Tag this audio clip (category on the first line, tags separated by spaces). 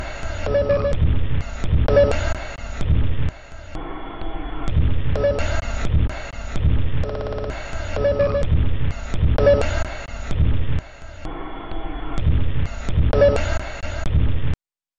Instrument samples > Percussion
Drum Loop Samples Soundtrack Underground Weird